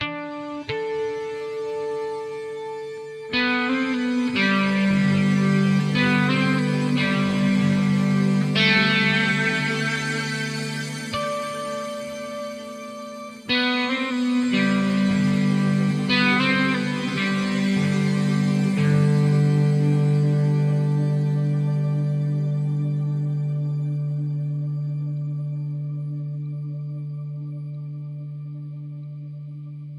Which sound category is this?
Music > Solo instrument